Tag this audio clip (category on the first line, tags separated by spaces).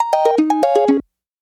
Music > Other
ui sfx sound-logo bumper adventure game chime sound-design motif stinger effect soundeffect bump jingle harp